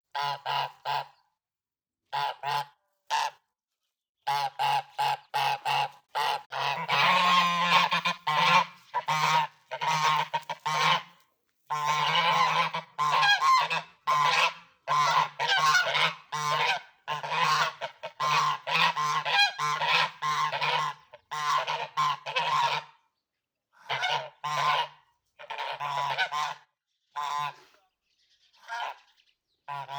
Sound effects > Animals
SFX-Geese
SFX vocalization of two Geese. Recorded with iPhone 14 internal microphone, then isolated the sound using iZotope RX.
Goose, Geese, SFX, Birds, France